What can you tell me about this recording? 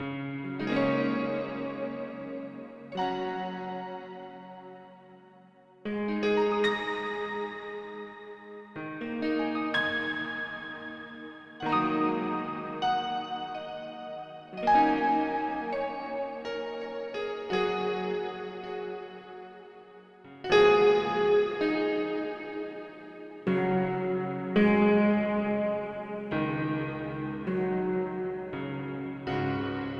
Music > Solo instrument
a mellow soft piano chord progression washed out with reverb, created in FL Studio and processed in Reaper